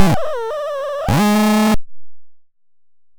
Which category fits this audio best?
Sound effects > Electronic / Design